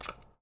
Sound effects > Objects / House appliances
Swiping picture out of a Fuji Instax Mini 9 camera.